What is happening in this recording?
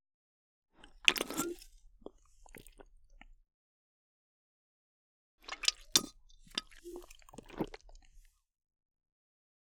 Sound effects > Human sounds and actions
Sipping Soda From a Can With Swallow
Sound effect of drinking soda from a can. Two different takes. Recorded on the Neumann TML 103 and edited in Audacity to remove background noise.
beverage, can, cola, drink, drinking, liquid, pop, sipping, soda, swallow